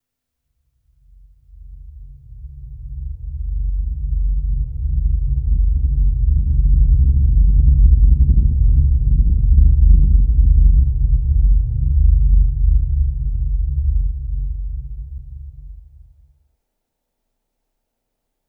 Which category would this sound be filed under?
Sound effects > Electronic / Design